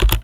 Sound effects > Objects / House appliances
FUJITSU Computers Keyboard - T key Press Mono
Keyboard, Close-up, individual-key, key-press, Zoom-H2N, Zoom-Brand, H2N, Key